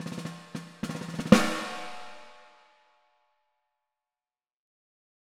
Music > Solo percussion
snare Processed - fill - 14 by 6.5 inch Brass Ludwig
percussion, flam, perc, snareroll, processed, realdrum, crack, roll, brass, reverb, rimshot, ludwig, snare, hits, acoustic, hit, sfx, rimshots, drumkit, snares, rim, kit